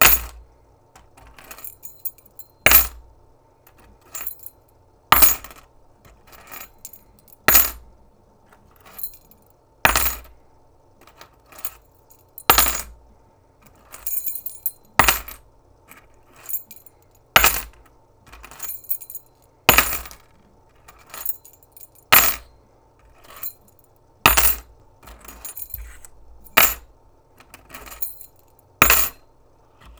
Sound effects > Objects / House appliances
OBJKey-Blue Snowball Microphone, CU Keys Drop, Pick Up Nicholas Judy TDC
Keys dropping and picking up.